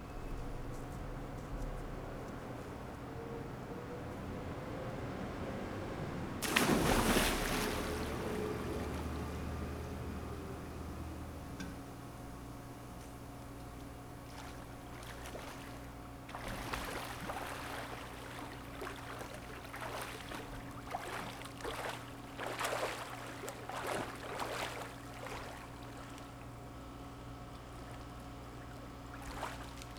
Soundscapes > Urban
AMBSea-Summer Sunrise Swim in Condo Pool, splashing, AC hum QCF Gulf Shores Alabama Zoom H3VR
Early morning solo swim in pool in condo beach house complex courtyard - AC Hum, birdsong (Geolocation note: Map doesn't show complex on map, newer construction)
AC-Hum, condo, pool, summer, swimming